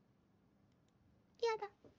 Sound effects > Human sounds and actions
yadaやだ
Female copulatory vocalizations as a clumsy aroused refusal